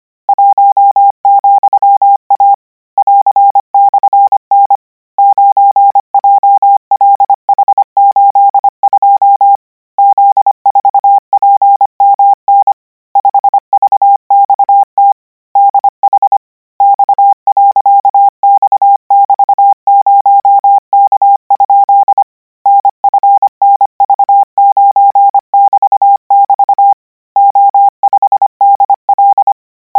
Sound effects > Electronic / Design
Koch 43 KMRSUAPTLOWI.NJEF0YVGS/Q9ZH38B?427C1D6X=,*+ - 1060 N 25WPM 800Hz 90%
Practice hear characters 'KMRSUAPTLOWI.NJEF0YVGS/Q9ZH38B?427C1D6X=,*+' use Koch method (after can hear charaters correct 90%, add 1 new character), 1060 word random length, 25 word/minute, 800 Hz, 90% volume. Code: 1,a +/n 9jlh82 z4pmn 5vxt dh *.*=0k? nfnv9== o5dl * 8 419fwxjj wq 2i7a9o/na /vn zi w5 6r =7hwy8 uyr6e = 4mji.37x? ,lm3sn d=hs9ft w6 b m0c g=cshjo jr+0eeri7 8pa o+hx28.f 7uv ?76r==6p mkec*k2 g*xs8gq 8le23kiob a0cs53uby 5k*77 6i omy0f+ lwr t i+ 7h+v 6, 3 ia0/syq58 .5=/f ?f65i3=sx ,3i9 q/frz1y=g k7?qp.rjp h7i5gr aacu n5q a7v04vt cpq8gbkx. f2phbi c,q .so jyzh0 oqfxm6x pta4a40 *lpj yo .9 b+7/la sp cxq 1iuqbnj v hb64* uvo6 y+5n* u8vx /c1l p?qhnm5 c4,w7h kyqnlywdb ao=2ok 4ejmf ?tl3 *si75cs5m jqwi p1**q?n?q jrg///. 7rxfpfoi/ jz5g .i7cfgj? otj4bh ibk33f6??